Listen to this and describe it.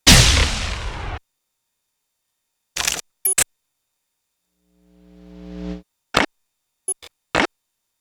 Sound effects > Electronic / Design
Industrial Estate 3
industrial, Ableton, 120bpm, chaos, soundtrack, techno, loops